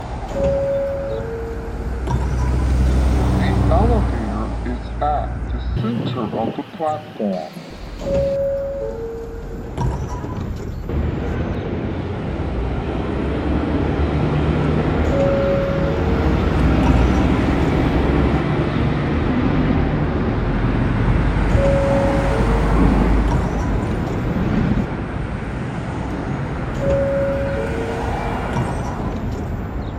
Soundscapes > Urban
NESS MYBLOCK2812
Needed a place to upload this so it wouldn't save directly to the lab computers. Collection of key sounds in my neighborhood I recorded across a four-day span.
field-recording, neighborhood, outdoors